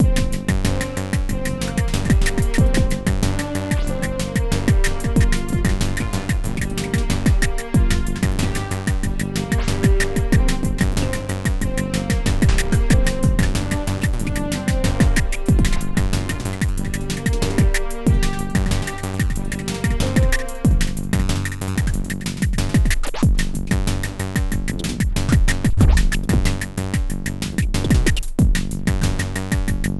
Music > Multiple instruments
a chill but glitchy beat and melody I created in FL Studio using various VSTs